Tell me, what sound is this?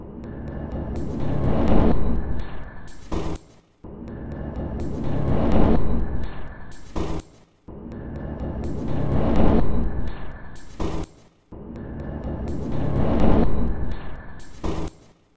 Instrument samples > Percussion
This 125bpm Drum Loop is good for composing Industrial/Electronic/Ambient songs or using as soundtrack to a sci-fi/suspense/horror indie game or short film.
Drum, Dark, Samples, Ambient, Industrial, Packs